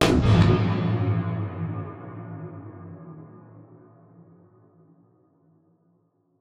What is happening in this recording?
Sound effects > Experimental
dark impact verb percs 18 by CVLTIVR
whizz, perc, fx, edm, hiphop, impact, snap, impacts, glitchy, zap, pop, crack, otherworldy, idm, experimental